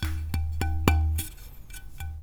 Music > Solo instrument
Marimba Loose Keys Notes Tones and Vibrations 37-001
block, foley, fx, keys, loose, marimba, notes, oneshotes, perc, percussion, rustle, thud, tink, wood, woodblock